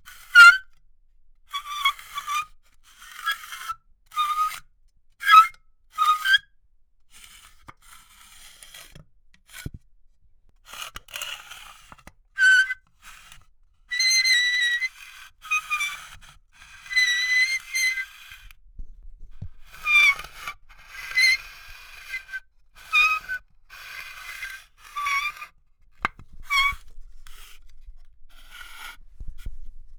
Sound effects > Objects / House appliances
Soap tray sliding (annoying sound)
Subject : Sliding a plastic soap tray against the bathroom sink, to make this stringent/strident sound. Date YMD : 2025 04 22 Location : Gergueil France. Hardware : Tascam FR-AV2, Rode NT5 Weather : Processing : Trimmed and Normalized in Audacity.
stringent, strident, soap-tray, screetching, NT5, fr-av2, rubbing, plastic, tascam, moving, rode